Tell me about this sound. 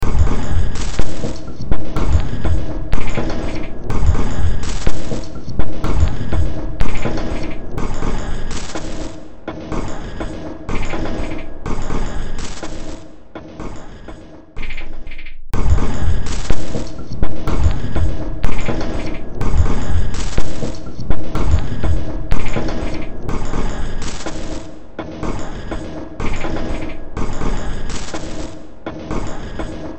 Music > Multiple instruments
Demo Track #3140 (Industraumatic)
Ambient, Cyberpunk, Games, Horror, Industrial, Noise, Sci-fi, Soundtrack, Underground